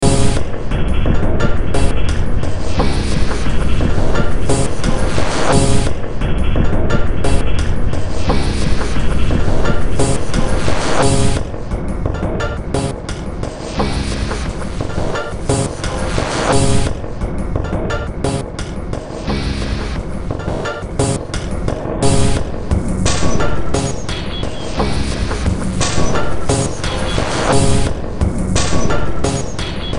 Music > Multiple instruments
Demo Track #3764 (Industraumatic)
Ambient, Cyberpunk, Games, Horror, Industrial, Noise, Sci-fi, Soundtrack, Underground